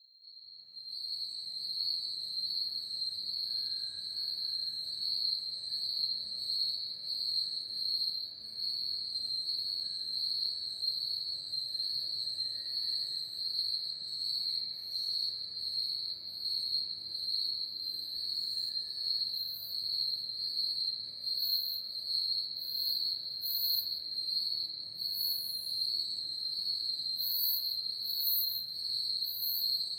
Soundscapes > Nature
Crickets - Jungle & Summer day
Forest crickets in the mountains in the late afternoon. Their song evokes a tropical forest in a sunny environment. * No background noise. * No reverb nor echo. * Clean sound, close range. Recorded with Iphone or Thomann micro t.bone SC 420.